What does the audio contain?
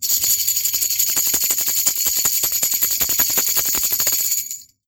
Music > Solo percussion
MUSCShake-Samsung Galaxy Smartphone, CU Tambourine, Long Nicholas Judy TDC
A long tambourine shake. Recorded at Goodwill.
long, Phone-recording, shake, tambourine